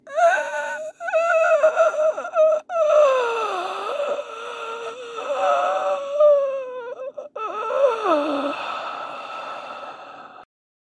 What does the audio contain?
Sound effects > Other
Dying Sound
Sound effect of a person dying